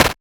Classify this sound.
Instrument samples > Percussion